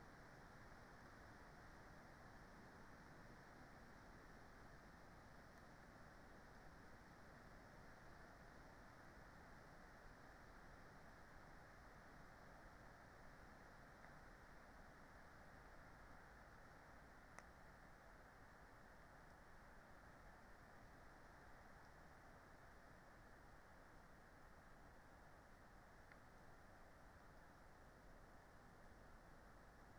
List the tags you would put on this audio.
Soundscapes > Nature
soundscape Dendrophone artistic-intervention sound-installation alice-holt-forest natural-soundscape modified-soundscape nature data-to-sound phenological-recording field-recording weather-data raspberry-pi

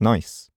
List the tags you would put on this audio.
Speech > Solo speech
FR-AV2 Shotgun-microphone july MKE-600 serious Calm Generic-lines VA Adult mid-20s Voice-acting 2025 MKE600 Single-mic-mono Male Sennheiser Tascam nice Hypercardioid Shotgun-mic

